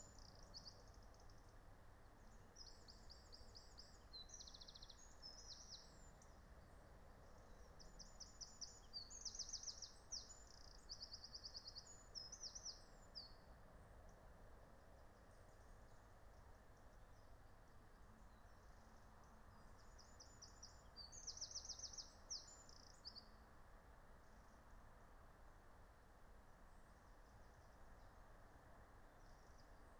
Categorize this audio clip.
Soundscapes > Nature